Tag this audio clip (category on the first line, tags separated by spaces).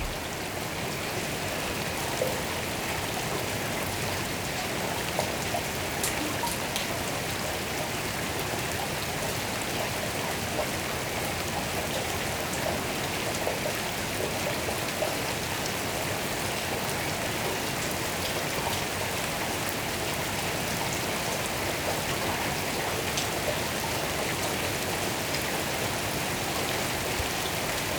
Soundscapes > Nature
Drops Rain splashing waters